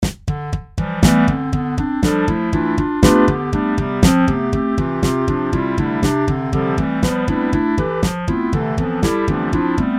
Music > Multiple instruments
Very random notes that I just made with a bit of effort put into it
Cool, Normal, Random